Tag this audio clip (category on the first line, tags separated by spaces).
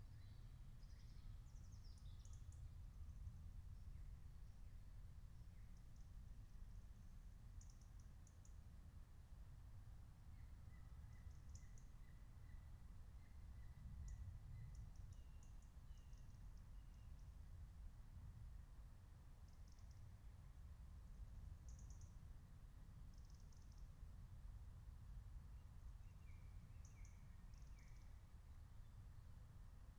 Soundscapes > Nature
raspberry-pi nature soundscape field-recording phenological-recording meadow alice-holt-forest natural-soundscape